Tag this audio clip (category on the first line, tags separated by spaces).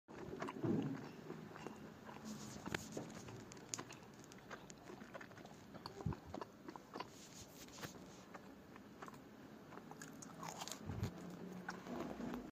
Sound effects > Human sounds and actions
chewing munching eating